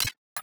Instrument samples > Percussion
Glitch-Perc-Glitch Cymbal 2
Cymbal, Digital, Effect, FX, Glitch